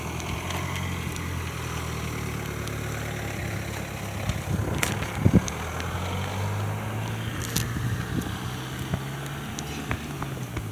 Sound effects > Vehicles
van takeoff
Van pulling out of parking lot. Recorded with my phone.
vehicle, motor, automobile, drive, driving, car, engine